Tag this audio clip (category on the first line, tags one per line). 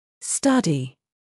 Speech > Solo speech
english
pronunciation
voice
word